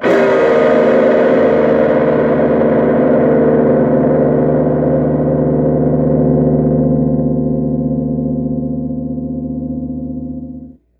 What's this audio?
Music > Solo instrument
MUSCInst Electric Guitar, Single Chord Blast Nicholas Judy TDC
A single electric guitar chord blast.
blast, Blue-brand, Blue-Snowball, chord, electric-guitar, single